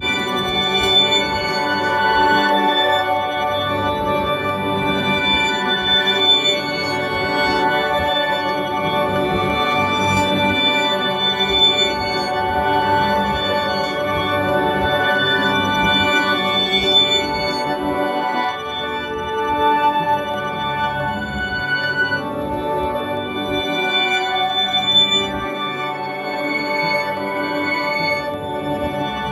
Soundscapes > Synthetic / Artificial
Her souls linger and so does this track made in albeton live